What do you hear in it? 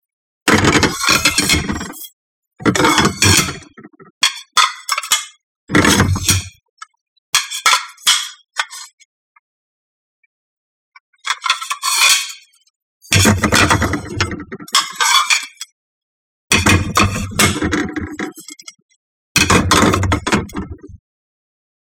Sound effects > Objects / House appliances
porcelain-plates-stacking
Plates clicking as they’re stacked. Recorded with Zoom H6 and SGH-6 Shotgun mic capsule.
kitchen, plate, porcelain, sliding